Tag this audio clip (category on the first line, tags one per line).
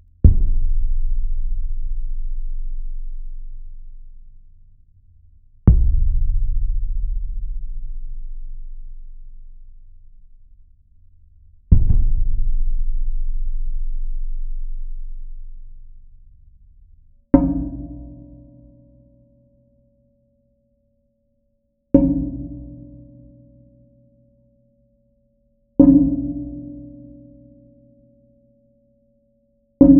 Sound effects > Other mechanisms, engines, machines
banging
geofone
hitting
metal
metallic